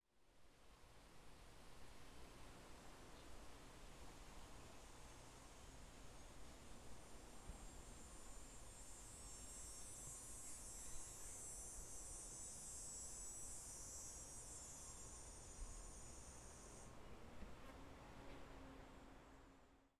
Soundscapes > Nature
Cicadas W breeze
Field recording of cicadas with the wind rustling branches
Recording, Natural, Summer, Nature, Day, Bug, Cicada